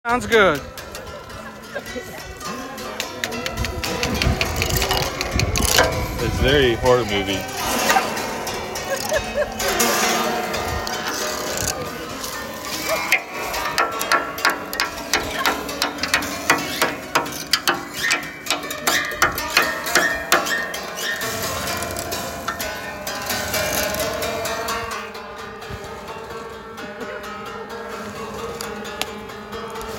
Soundscapes > Nature
Harp heart removal 01/11/2025
Harp heart removal from piano , my 50th birthday